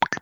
Sound effects > Electronic / Design
Button Click
A short click sound. I recorded my voice with mic and processed a bit in Audacity.